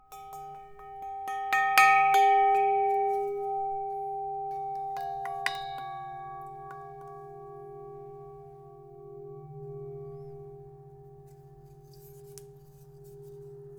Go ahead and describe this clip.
Sound effects > Other mechanisms, engines, machines
Woodshop Foley-018
bam, bang, boom, bop, crackle, foley, fx, knock, little, metal, oneshot, perc, percussion, pop, rustle, sfx, shop, sound, strike, thud, tink, tools, wood